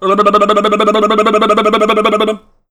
Sound effects > Human sounds and actions
TOONVox-Blue Snowball Microphone, CU Vocal Head Shake, Comical Nicholas Judy TDC
A vocal head shake. Comical.
shake, cartoon, head, Blue-brand, comical